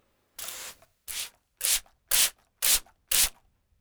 Soundscapes > Indoors
spray bottle, on-field rec, zoom h1e + noise reduction
bottle, spraying, sprays, water, splash, cleaner, plastic, container, scatter, spray